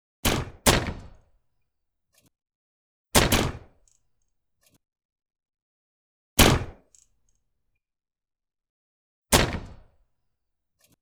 Sound effects > Other mechanisms, engines, machines
custom optimus prime mechanical impact sound 07042025
sounds that I used to make this custom michaelbay inspired optimus transformation impact sounds.
designed, automatan, cyborg, droid, mechanism, mech